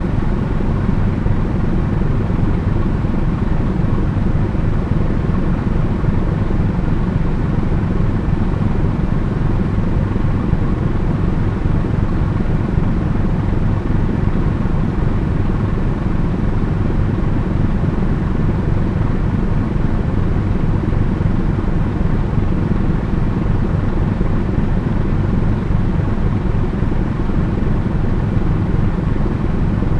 Sound effects > Other mechanisms, engines, machines
250801 194635 PH Ferry boat engine and exhaust system
Ferryboat engine and exhaust system. Recorded between Calapan city and Batangas city (Philippines), in August 2025, with a Zoom H5studio (built-in XY microphones). Fade in/out applied in Audacity.